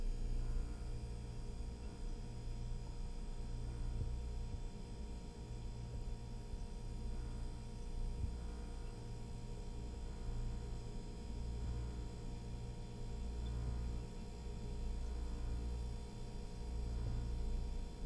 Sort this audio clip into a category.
Sound effects > Objects / House appliances